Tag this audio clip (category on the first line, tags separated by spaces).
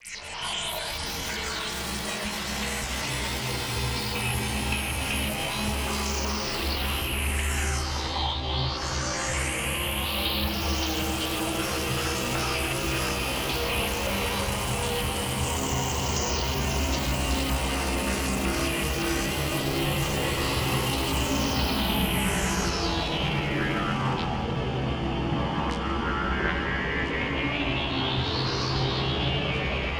Soundscapes > Synthetic / Artificial
shimmer fx ambience effect rumble slow texture atmosphere bassy alien glitch synthetic glitchy wind evolving dark ambient shifting drone shimmering howl bass experimental sfx landscape long low roar